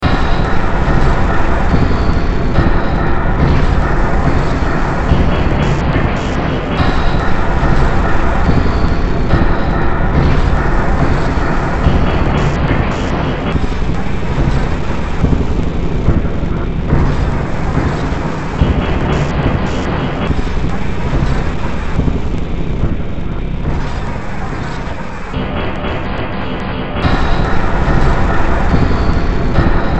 Music > Multiple instruments
Demo Track #3814 (Industraumatic)
Ambient, Cyberpunk, Industrial, Noise, Sci-fi, Soundtrack, Underground